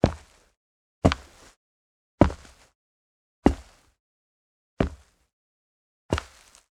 Sound effects > Human sounds and actions
Footsteps - Stone, Rock, Concrete, Cement
Sounds of footsteps on a stone material. Recorded in a foley pit with the sennheiser MKH416. Used a slab of concrete.
concrete, feet, foot, footsteps, shoe, step, stone, walk, walking